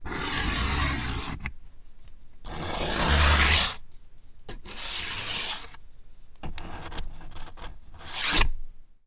Sound effects > Objects / House appliances
Dare2025-10 Friction - Cardboard x paper
Subject : A recording made for Friction series of dare, Dare2025-10 "Cardboard / Paper". By rubbing a cardboard box with a piece of paper folded in two. H5 placed inside. Date YMD : 2025 June 21 Location : France. Hardware : Zoom H5 XY. Flimsy recording setup on pillow or something. Weather : Processing : Trimmed in Audacity probably some slicing. Normalised.